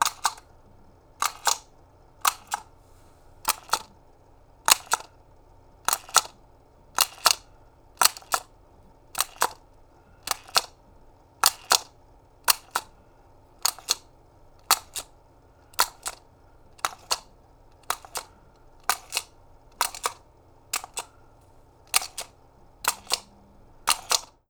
Sound effects > Objects / House appliances
A spinning face toy.